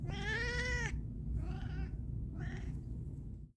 Sound effects > Animals
ANMLCat-Samsung Galaxy Smartphone, MCU Meowing, X3 Nicholas Judy TDC
A cat meowing three times.